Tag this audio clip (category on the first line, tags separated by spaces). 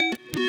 Sound effects > Electronic / Design
alert button digital interface menu notifications options UI